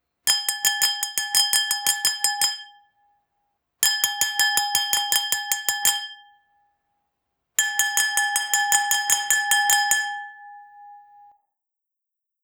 Sound effects > Objects / House appliances
Glass - Toast
A glass hit by a dessert spoon. Is there another way to grab your guests' attention? * No background noise. * No reverb nor echo. * Clean sound, close range. Recorded with Iphone or Thomann micro t.bone SC 420.